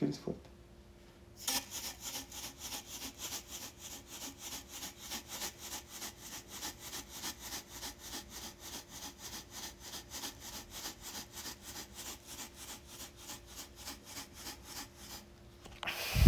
Sound effects > Experimental
TOONVox agitation breathing cartoon MPA FCS2
agitated breathing cartoon
agitation, breathing, cartoon